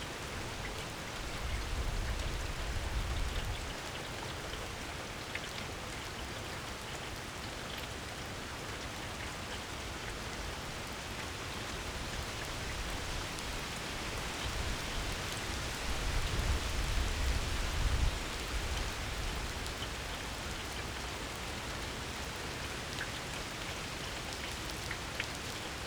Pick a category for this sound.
Soundscapes > Nature